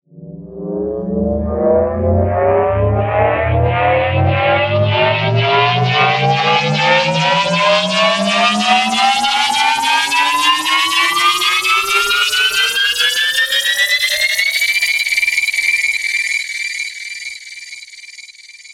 Sound effects > Electronic / Design
Dissonant Alien Riser/Powerup
Alien, Effect, Powerup, Riser